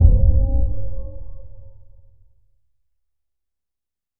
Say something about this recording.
Sound effects > Electronic / Design
RUMBLY SUNK HIT
BASSY, BOLHA, BOOM, BRASIL, BRASILEIRO, BRAZIL, BRAZILIAN, DEEP, EXPLOSION, FUNK, HIT, IMPACT, LOW, MANDELAO, PROIBIDAO, RATTLING, RUMBLING